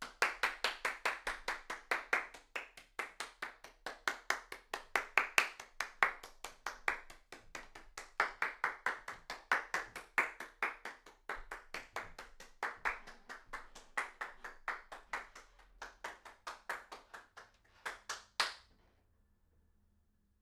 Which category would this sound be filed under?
Sound effects > Human sounds and actions